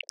Instrument samples > Percussion
Organic-Water Snap 10.2
This snap synthed with phaseplant granular, and used samples from bandlab's ''FO-REAL-BEATZ-TRENCH-BEATS'' sample pack. Processed with multiple ''Khs phaser'', and Vocodex, ZL EQ, Fruity Limiter. Enjoy your ''water'' music day!
EDM, Botanical, Glitch